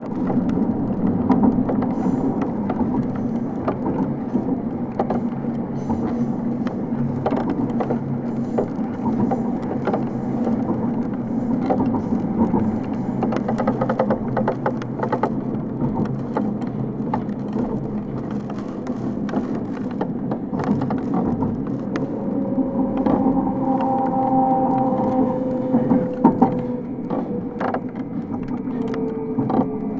Soundscapes > Urban
TRNInt-Contact Mic Subway train moving SoAM Sound of Solid and Gaseous Pt 1 A lot of creacking and footsteps
creak, creaking, creaky, metro, squeak, squeaking, squeaky, subway, train, underground